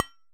Sound effects > Objects / House appliances
Solid coffee thermos-001
percusive, recording, sampling